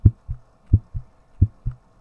Sound effects > Human sounds and actions
HMNHart-Blue Snowball Microphone, CU Simulated Nicholas Judy TDC
Blue-Snowball, heartbeat